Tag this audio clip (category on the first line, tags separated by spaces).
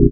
Instrument samples > Synths / Electronic
bass additive-synthesis fm-synthesis